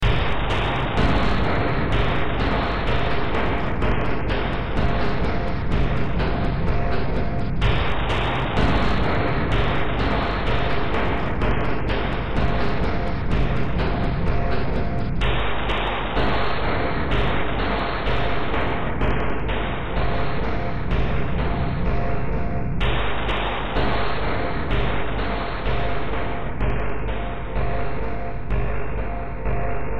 Music > Multiple instruments
Demo Track #3179 (Industraumatic)
Noise, Ambient, Soundtrack, Underground, Games, Horror, Cyberpunk, Industrial, Sci-fi